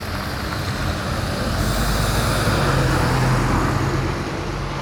Soundscapes > Urban
Bus moving at 25kmph (1)
Bus moving at 25 Kilometers per hour: Rusty sound of gravel on the road, revving engine, street background sound. Recorded with Samsung galaxy A33 voice recorder. The sound is not processed. Recorded on clear afternoon winter in the Tampere, Finland.
Bus, Street, Traffic